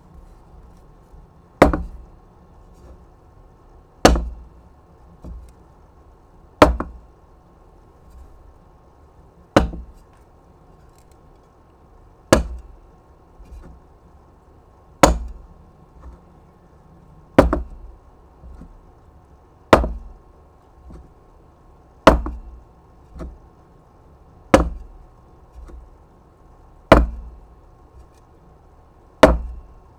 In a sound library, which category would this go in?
Sound effects > Objects / House appliances